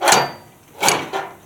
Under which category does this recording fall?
Sound effects > Objects / House appliances